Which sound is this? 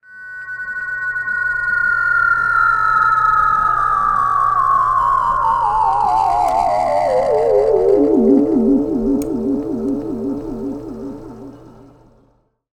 Sound effects > Electronic / Design
SCIRetro Synth Slide Down, 50's Space Nicholas Judy TDC
A 1950's synthesized spacey slide down. Created using Femur Design's Theremin app.